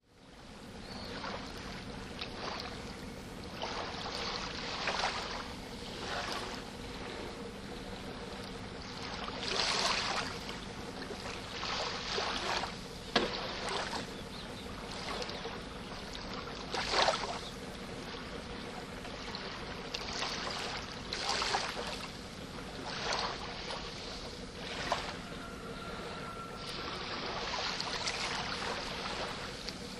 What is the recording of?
Soundscapes > Nature
Panormos Bay early morning atmosphere, boats, seashore
Early morning field-recording in Panormos Bay, Tinos, Greece. Fishermen can be heard starting their motors as they're setting out to sea to collect the nets they cast the night before. Some birds and the occasional rooster can be heard as well. This 16-bit recording was captured using an Olympus LS-11 linear PCM recorder.
Bay, beach, boats, fishermen, lapping, Mediterranean, motors, Panormos, roosters, sea, shore, surf, water, waves